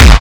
Instrument samples > Percussion
BrazilFunk Kick 14
BrazilFunk, Kick, Distorted